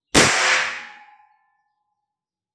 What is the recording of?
Sound effects > Natural elements and explosions
Pocket Pistol gunshot 2 (indoor)
(2/2) Gunshot of a small caliber pocket pistol, fired in a garage. Recorded using phone microphone.
bang, explosion, gun, gunshot, indoor, shoot, shot